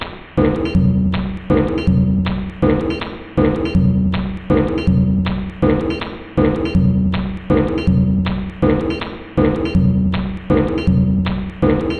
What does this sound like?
Instrument samples > Percussion
This 160bpm Drum Loop is good for composing Industrial/Electronic/Ambient songs or using as soundtrack to a sci-fi/suspense/horror indie game or short film.
Soundtrack, Weird, Loopable, Drum, Alien, Loop, Dark, Packs, Ambient, Samples, Industrial, Underground